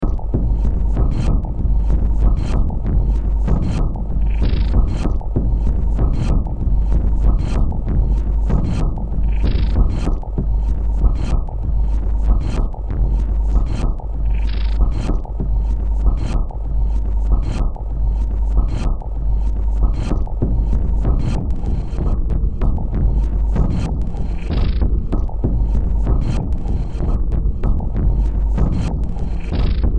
Music > Multiple instruments
Demo Track #3861 (Industraumatic)
Cyberpunk, Noise, Sci-fi